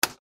Sound effects > Other mechanisms, engines, machines
Macro & Meso: This is a single, distinct key press from a vintage typewriter. The sound is a sharp, dry "tick" with no discernible background noise. Micro: The audio captures the raw, pure acoustic signature of the typewriter's key action. The sound is unadorned by echo or subtle ambient tones, focusing entirely on the percussive, metallic sound of the key striking the paper platen. Technical & Method: This sound was recorded approximately 2 years ago using an iPhone 14 smartphone in a quiet office room. The audio was processed using Audacity to remove any ambient noise, ensuring a clean and isolated sound. Source & Purpose: The typewriter is a real, classic Brazilian model, similar to a well-known brand such as the Olivetti Lettera 22. The purpose of this recording was to capture and preserve the unique, distinct sound of an iconic mechanical device for use in sound design, Foley, and other creative projects.